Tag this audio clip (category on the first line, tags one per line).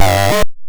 Sound effects > Electronic / Design
Alien
Analog
Bass
Digital
DIY
Dub
Electro
Electronic
Experimental
FX
Glitch
Glitchy
Handmadeelectronic
Infiltrator
Instrument
Noise
noisey
Optical
Otherworldly
Robot
Robotic
Sci-fi
Scifi
SFX
Spacey
Sweep
Synth
Theremin
Theremins
Trippy